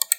Sound effects > Objects / House appliances
Short recording of a computer mouse, I cleaned it up a bit to remove background noise.